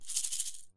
Instrument samples > Percussion
Dual shaker-017
recording, sampling, percusive